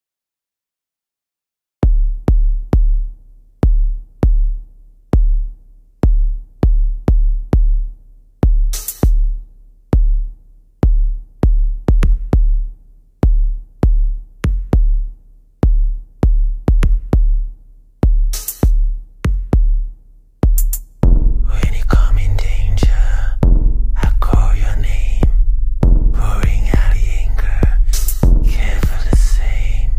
Music > Multiple instruments
Catch Spell Song (26WEEKS)
Paranormal Music , black magic soundtrack , witchcraft theme song Song from my album FACE made in Wave and Bandlab app , song with deep bass and spoken word male vocal emphasize a dark atmostpheric vibe.
airy, blackmagic, Black-magic, Catchspell, dark-magic, darkmagic, Magic, paranormal-music, paranormal-rhythm, paranormal-song, spell, witch, witchcraft